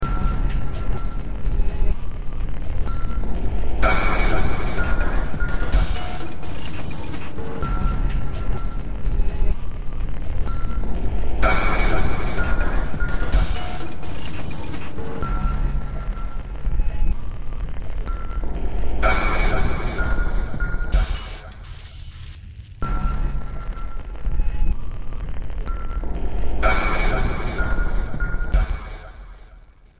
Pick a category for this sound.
Music > Multiple instruments